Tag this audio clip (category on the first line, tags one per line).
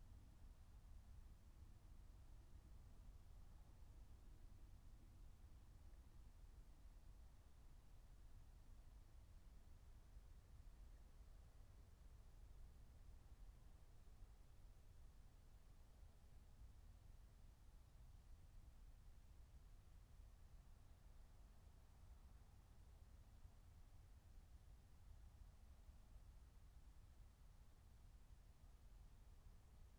Soundscapes > Nature
nature
field-recording
alice-holt-forest
phenological-recording
meadow
natural-soundscape
soundscape
raspberry-pi